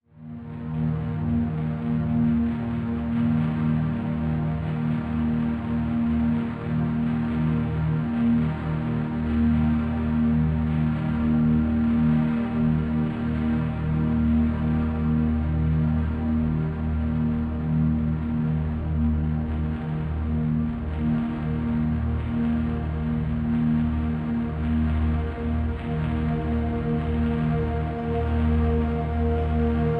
Soundscapes > Synthetic / Artificial
Microkorg & Chase Bliss Mood mk2 Drone pad.
An evolving drone sound I created using my microKORG, processed through the Chase Bliss Mood MKII. It has a warm, deep, and gritty character. Recorded in Ableton Live.
Ambiance, Ambience, Ambient, analog, Artificial, Buzzing, chasebliss, Cinematic, drone, Industrial, inflight, LFO, Machine, Machinery, Metal, Metallic, microkorg, Modeld, moodmk2, Movie, nightsky, Noise, oscillator, pulsing, resonating, reverb, Robot